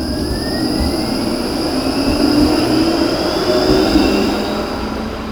Sound effects > Vehicles
A tram leaving in Tampere, Finland. Recorded with OnePlus Nord 4.
tram, transportation, vehicle